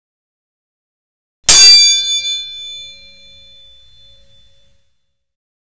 Sound effects > Objects / House appliances
Tanjiro or HF inspired silverware sword hit w ringout 06 V2 01212026
anime, attack, battle, blade, clang, combat, demonslayer, ding, duel, epee, fantasy, fate, fight, fighting, hit, impact, karate, knight, kung-fu, martialarts, medieval, melee, metal, ring, ringout, sword, swords, ting, war, weapon